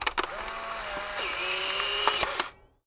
Sound effects > Objects / House appliances
COMCam-Blue Snowball Microphone, CU Polaroid Camera, Take Picture, Shoot, Dispense Picture Nicholas Judy TDC
A polaroid camera taking a picture, shooting and dispensing a picture.
camera, shoot, Blue-Snowball, take, dispense, picture, Blue-brand, polaroid